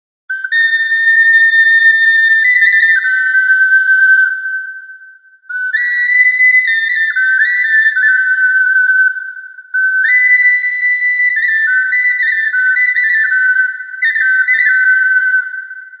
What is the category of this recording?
Instrument samples > Wind